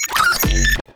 Sound effects > Experimental
Glitch Percs 24 fx shout
a collection of glitch percussion sfx made using a myriad of software vsts and programs such as reaper, fl studio, zynaptiq, minimal audio, cableguys, denise biteharder, and more
idm, clap, lazer, laser, pop, impact, edm, fx, otherworldy, snap, zap, sfx, glitch, alien, abstract, glitchy, hiphop, experimental, perc, crack, impacts, whizz, percussion